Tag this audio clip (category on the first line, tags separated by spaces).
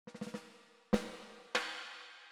Music > Solo percussion
roll acoustic processed oneshot reverb ludwig beat realdrum drum sfx snares rim flam rimshots hits rimshot drums percussion snaredrum hit snare perc realdrums snareroll fx drumkit kit crack brass